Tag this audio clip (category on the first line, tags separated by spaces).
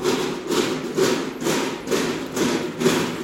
Sound effects > Objects / House appliances
Phone-recording wheels